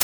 Sound effects > Other
The sound of a shovel entering dirt. Created with LMMS and Audacity.
dig, digging, dirt, gravel, shovel, shoveling